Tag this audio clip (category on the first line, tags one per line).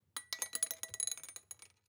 Sound effects > Other mechanisms, engines, machines
noise
garage
sample
chain